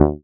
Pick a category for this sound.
Instrument samples > Synths / Electronic